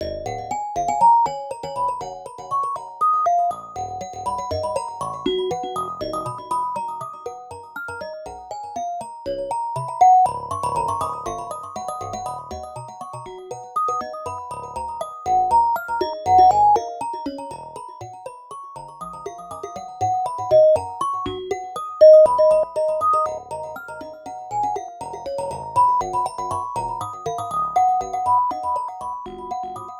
Music > Solo instrument
Hapi Tank Drum and a Xylophone Exploratory Loop 120bpm Aeolian Scale
Random combo filter and delay on top. Aeolin scale.